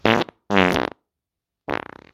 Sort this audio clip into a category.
Sound effects > Other